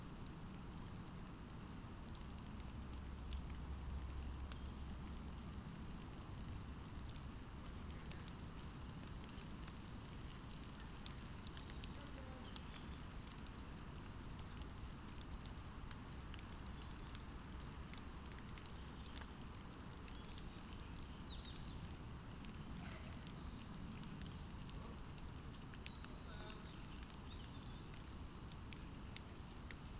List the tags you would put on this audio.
Soundscapes > Nature
phenological-recording soundscape data-to-sound nature weather-data sound-installation Dendrophone field-recording modified-soundscape natural-soundscape raspberry-pi artistic-intervention alice-holt-forest

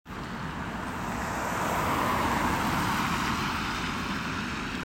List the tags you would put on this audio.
Sound effects > Vehicles
car tampere field-recording

